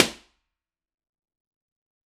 Soundscapes > Other
I&R Albi Bedroom at wardrobe without blanket castle
I recently recorded some IR's of a recording setup in my reverbery bedroom. To minimise the reverb, I did a sort of blanket fort around the microphone. I think here it's minimised being close to the floor/carpet and having more furniture on either side than a typical head position. Tascam FR-AV2 Rode NT5 with omni capsule. Balloon pop at 20-30cm ish, mic at 170cm ish. Room dimensions : 4m90 X 3m X 2m70
Balloon, Bedroom, convolution, convolution-reverb, FR-AV2, home, impulse, Impulse-and-response, IR, NT45-O, NT5-o, NT5o, pop, resonating, response, reverb, Rode, Tascam